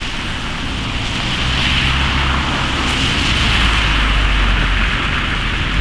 Sound effects > Vehicles
Car00082464CarMultiplePassing
automobile, car, drive, field-recording, rainy, vehicle